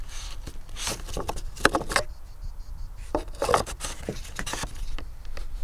Sound effects > Vehicles
Ford 115 T350 - Oil tank open n close
115, 2003, 2003-model, 2025, A2WS, August, Ford, Ford-Transit, France, FR-AV2, Mono, Old, Single-mic-mono, SM57, T350, Tascam, Van, Vehicle